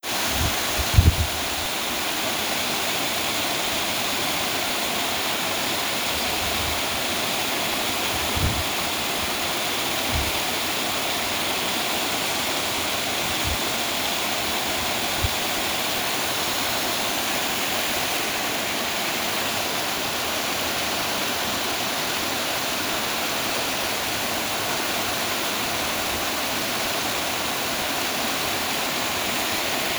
Sound effects > Natural elements and explosions
From a big stream in Hvalvik, Faroe Islands. Almost a water fall. Recorded with Samsung phone.
creek, running, stream, water